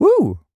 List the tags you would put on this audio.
Speech > Solo speech
Adult
Calm
FR-AV2
Generic-lines
happy
Hypercardioid
july
Male
mid-20s
MKE-600
MKE600
Sennheiser
Single-mic-mono
VA
whouh
wouhouh

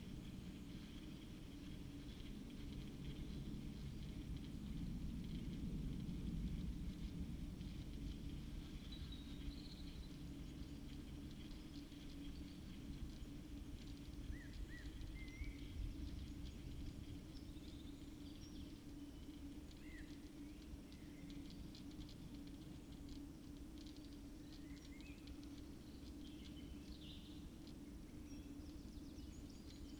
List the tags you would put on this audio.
Soundscapes > Nature
modified-soundscape
nature
natural-soundscape
phenological-recording
raspberry-pi
field-recording
data-to-sound
sound-installation
alice-holt-forest
soundscape
weather-data
artistic-intervention

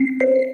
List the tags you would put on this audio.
Sound effects > Electronic / Design
confirmation
interface
selection

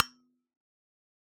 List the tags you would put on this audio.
Sound effects > Objects / House appliances
percusive
recording
sampling

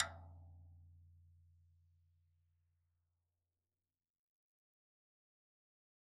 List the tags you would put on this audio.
Music > Solo percussion
acoustic
beat
beatloop
beats
drum
drumkit
drums
fill
flam
floortom
instrument
kit
oneshot
perc
percs
percussion
rim
rimshot
roll
studio
tom
tomdrum
toms
velocity

